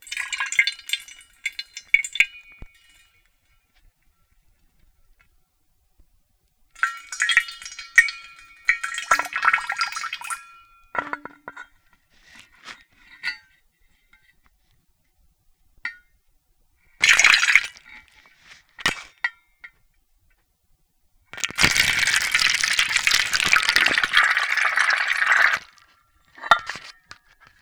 Sound effects > Experimental
contact mic in metal thermos, water drops1
Filling a thermos slowly with pauses. Less a constant stream and more water droplets.
water, water-bottle